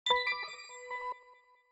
Sound effects > Electronic / Design
Pickup / Notification Videogame UI Sound
videojuego, glitter, final, ui, musical, blessing, success, boton, ux, metallic, pickup, glamour, game, up, menu, cheerful, videogame, interface, pure, positive, glockenspiel, app, positivo, click, bells, button, level, interfaz, happy, juego